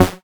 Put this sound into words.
Instrument samples > Synths / Electronic
CINEMABASS 4 Gb
additive-synthesis, bass, fm-synthesis